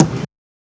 Instrument samples > Percussion
Samples recorded during my time as a cashier summer 2017 newly mixed and mastered for all your audio needs.